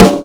Instrument samples > Percussion
snare blend of 6×13 DW Edge and A&F Drum Co. 5.5x14 Steam Bent - trigger muffled 2
Too muffled to be good. tags: trigger, triggersnare. trigger-snare
atheosnare, blendsnare, deathdoom, death-metal, doomdeath, drum, drums, DW, Godsnare, Ludwig, mainsnare, metal, mixed-snare, percussive, pop, snare, snareblend, snared, The-Godsnare, trigger, trigger-snare, triggersnare